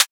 Instrument samples > Synths / Electronic
An IDM topper style percussion hit made in Surge XT, using FM synthesis.
surge, fm